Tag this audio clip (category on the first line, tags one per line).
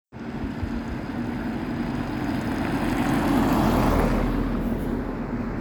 Sound effects > Vehicles
car
moderate-speed
passing-by
asphalt-road
wet-road
studded-tires